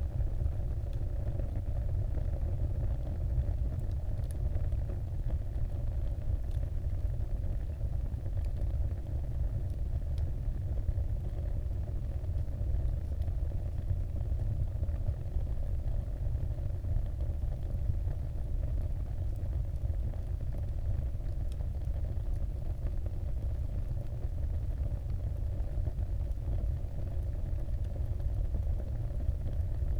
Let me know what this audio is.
Sound effects > Natural elements and explosions
SFX Indoor ForcedFireplace
Record Zoom h1n
field-recording, foley, intense, warm, stove, crackle, indoor, background, pressure, soundscape, forceful, fire